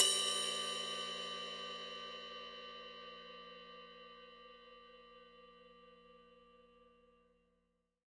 Music > Solo instrument
Cymbal hit with knife-002
Crash, Custom, Cymbal, Cymbals, Drum, Drums, FX, GONG, Hat, Kit, Metal, Oneshot, Paiste, Perc, Percussion, Ride, Sabian